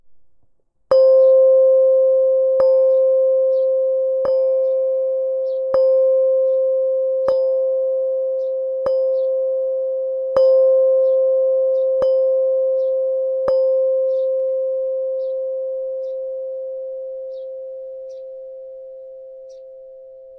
Instrument samples > Percussion
wah wah tube by Schlagwerk producing several short lasting overtones recorded on my Pixel 6pro
tube, percussion, bigtube